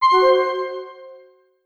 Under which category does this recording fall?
Sound effects > Electronic / Design